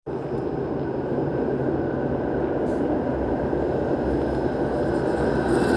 Soundscapes > Urban
voice 10 18-11-2025 tram
Tram, Rattikka, TramInTampere